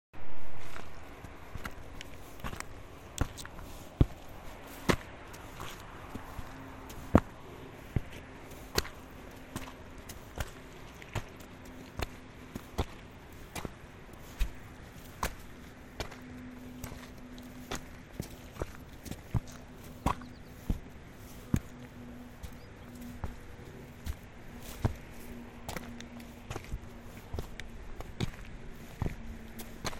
Soundscapes > Nature
passi montagna (mountain climbing steps)
cellphone recording of trekking steps on mountain path